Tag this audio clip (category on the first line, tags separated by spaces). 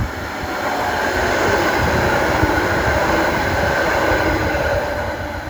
Soundscapes > Urban
field-recording Drive-by Tram